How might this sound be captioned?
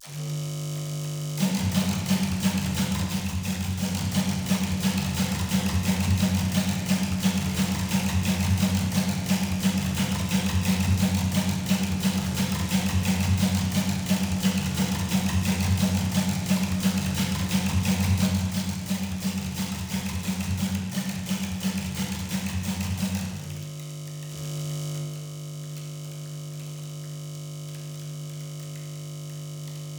Music > Solo percussion
Simple Bass Drum and Snare Pattern with Weirdness Added 021
FX-Drum, Fun, FX-Laden, Snare-Drum, Experimental, FX-Laden-Simple-Drum-Pattern, Silly, Noisy, Experiments-on-Drum-Patterns, Four-Over-Four-Pattern, FX-Drums, Simple-Drum-Pattern, Bass-Drum, Experimental-Production, Bass-and-Snare, Experiments-on-Drum-Beats, Interesting-Results, Glitchy, FX-Drum-Pattern